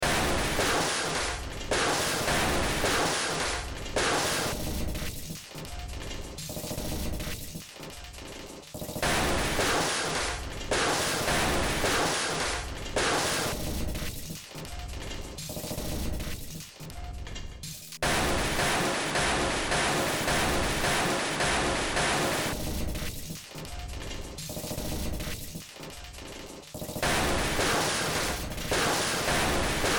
Music > Multiple instruments
Short Track #3833 (Industraumatic)
Games, Horror, Ambient, Noise, Sci-fi, Industrial, Underground, Soundtrack, Cyberpunk